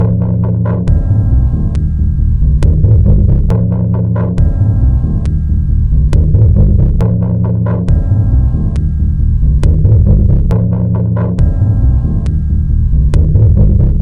Soundscapes > Synthetic / Artificial
This 137bpm Ambient Loop is good for composing Industrial/Electronic/Ambient songs or using as soundtrack to a sci-fi/suspense/horror indie game or short film.
Alien, Ambient, Dark, Drum, Industrial, Loop, Loopable, Packs, Samples, Soundtrack, Underground, Weird